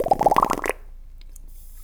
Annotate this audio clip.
Sound effects > Objects / House appliances
mouth sfx recorded with tascam field recorder